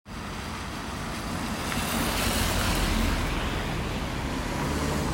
Sound effects > Vehicles
A car passing by from distance on Lindforsinkatu 2 road, Hervanta aera. Recorded in November's afternoon with iphone 15 pro max. Road is wet.